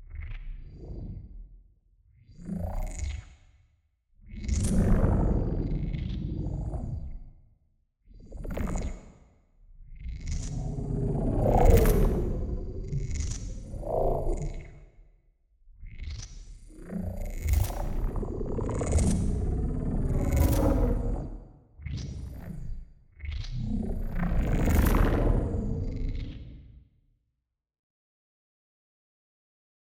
Sound effects > Electronic / Design
warped wave 1 2
alien, ambience, analog, bass, creature, creepy, dark, digital, experimental, extraterrestrial, fx, glitch, glitchy, gross, industrial, loopable, machanical, machine, monster, otherworldly, sci-fi, sfx, soundeffect, sweep, synthetic, trippy, underground, warped, weird, wtf